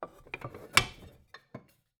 Music > Solo instrument
Marimba Loose Keys Notes Tones and Vibrations 19-001
block, foley, fx, keys, loose, marimba, notes, oneshotes, perc, percussion, rustle, thud, tink, wood, woodblock